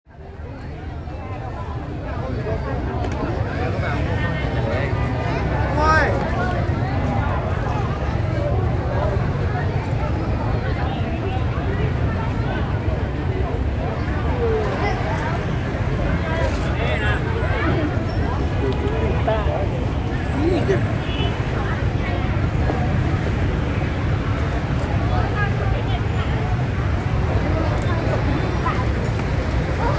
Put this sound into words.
Soundscapes > Urban
Walking through Hanoi old town, Vietnam. 29/1/25
cityscape, fieldrecording, traffic, hanoi, city, soundwalk, Walking